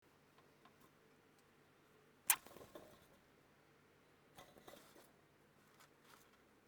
Sound effects > Animals
hamster Squeak， animal sound ，
a hamster's Squeak I recording it by myself by using s sony voice recorder. (ICD-UX560F) The vioce is from by own hamster.